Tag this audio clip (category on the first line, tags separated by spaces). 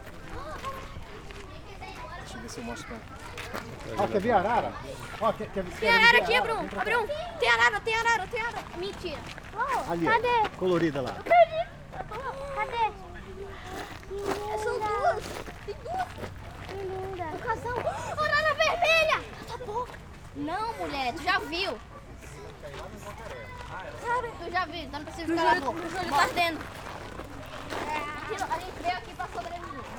Soundscapes > Nature
crowd children amazon nature brazil INPA soundscape sound-studies manaus amazonas walla brasil field-recording birds